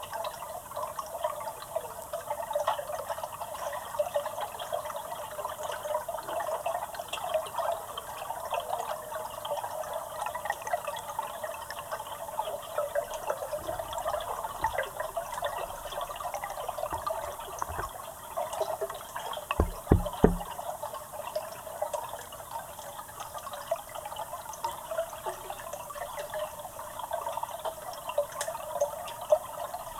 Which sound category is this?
Soundscapes > Other